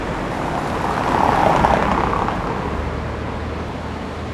Sound effects > Vehicles
Car tyres on cobbled street
The rippling sound of tyres as cars drive past on a cobbled road. Recorded in Oaxaca, Mexico, on a hand-held Zoom H2 in July 2023.